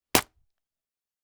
Sound effects > Objects / House appliances
Subject : Stumping a soda-can flat. They were the tall 33cl cola kind. Date YMD : 2025 July 20 Location : Albi 81000 Tarn Occitanie France. Sennheiser MKE600 P48, no filter. Weather : Processing : Trimmed in Audacity. Notes : Recorded in my basement.